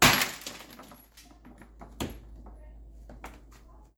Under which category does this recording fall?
Sound effects > Objects / House appliances